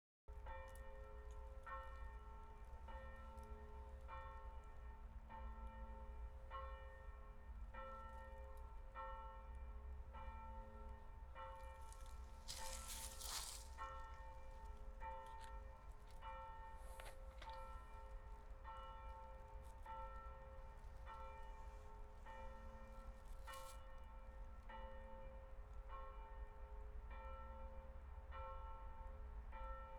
Soundscapes > Urban
Nothing. Evening and silence in the cemetery.
A moment of reflection at the cemetery and remembrance of those who have passed away Tascam DR680 Mk2 and two Audio-Technica U851 #0:00 - Church bells #0:50 - Silent